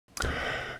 Speech > Solo speech
inhalation + tsk/ tongue click/ tut